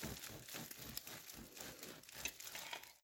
Sound effects > Objects / House appliances
FOODIngr-Samsung Galaxy Smartphone, MCU Sawing Bread Nicholas Judy TDC
Someone sawing bread.
Phone-recording, saw, bread, foley